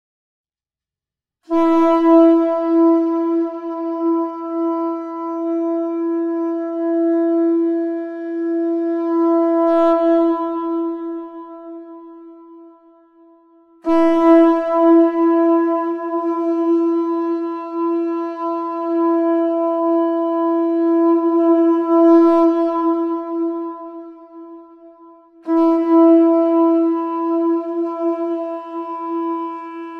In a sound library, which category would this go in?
Instrument samples > Wind